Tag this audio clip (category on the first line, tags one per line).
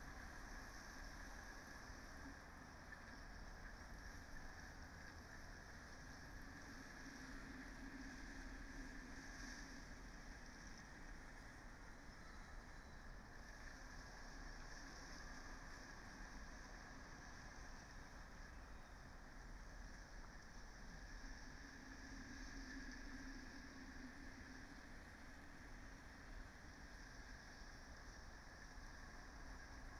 Soundscapes > Nature
alice-holt-forest
data-to-sound
Dendrophone
raspberry-pi